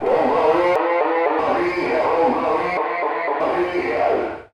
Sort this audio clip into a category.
Music > Other